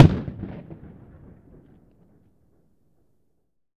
Sound effects > Natural elements and explosions
I recorded this with a Portacapture x8 recorder on a parking lot. A 24cm diameter balloon was overinflated to the point where it pops. Balloon was popped in a fabric bag to minimize junk
192, 32, Balloon, Bang, Boom, Explosion, Flashbang, float, Pop, Thunder